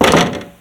Sound effects > Objects / House appliances
door pool close2
Door being slammed shut. Recorded with my phone.